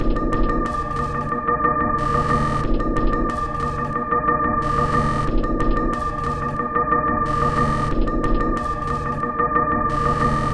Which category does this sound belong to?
Instrument samples > Percussion